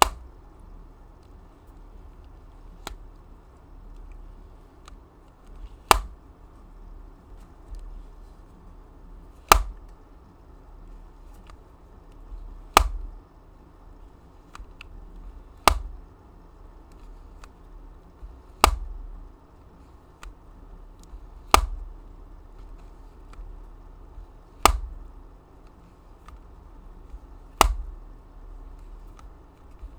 Sound effects > Objects / House appliances
GAMEVideo-Blue Snowball Microphone, MCU Nintendo, DS, Console, Open, Close Nicholas Judy TDC
A Nintendo DS console opening and closing.
Blue-brand, foley, open